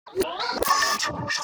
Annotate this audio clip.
Sound effects > Experimental
Gritch Glitch snippets FX PERKZ-009
lazer, experimental, glitch, laser, clap, alien, edm, otherworldy, percussion, idm, sfx, pop, crack, perc, impact, snap, hiphop, abstract, fx, zap, glitchy, impacts, whizz